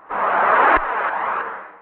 Instrument samples > Synths / Electronic
CVLT BASS 108
low, subs, sub, synth, drops, lowend, bass, subbass, stabs, synthbass, clear, wavetable, lfo, bassdrop, subwoofer, wobble